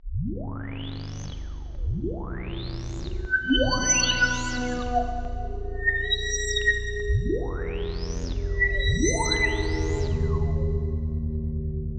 Soundscapes > Synthetic / Artificial
PPG Wave 2.2 Boiling and Whistling Sci-Fi Pads 13
cinematic, content-creator, dark-design, dark-soundscapes, dark-techno, drowning, horror, mystery, noise, noise-ambient, PPG-Wave, science-fiction, sci-fi, scifi, sound-design, vst